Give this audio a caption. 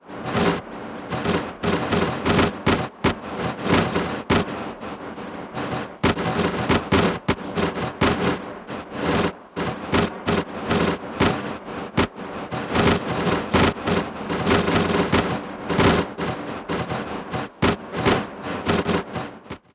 Sound effects > Other mechanisms, engines, machines
Tough Engine 5
Hi! That's not recorded sound :) I synth it with phasephant! Used a sound from Phaseplant Factory: MetalCaseShut1. I put it into Granular, and used distortion make it louder! Enjoy your sound designing day!
Engine, Broken, Machine